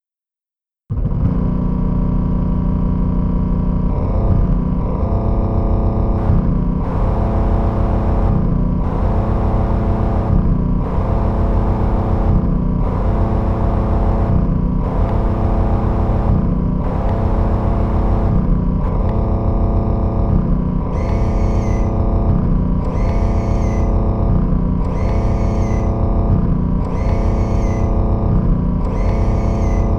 Soundscapes > Urban
This could be some industrial machine, It is recorded from an Urban Exploration situation, no clue what it is. The tags are from wild guesses. will update the description once anyone can identify it